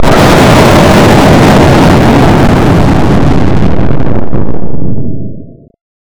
Sound effects > Other
(VOLUME WARNING!) Strange snare
Another strange sound made in FL Studio using fruity blood Overdrive Waveform is destroying physics